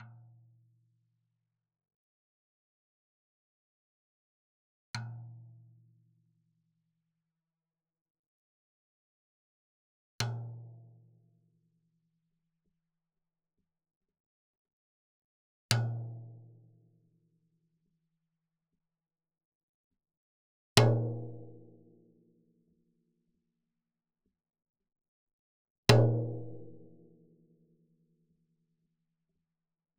Music > Solo percussion
med low tom-rimshot velocity build oneshot sequence 12 inch Sonor Force 3007 Maple Rack
acoustic, beat, drum, drumkit, drums, flam, kit, loop, maple, Medium-Tom, med-tom, oneshot, perc, percussion, quality, real, realdrum, recording, roll, Tom, tomdrum, toms, wood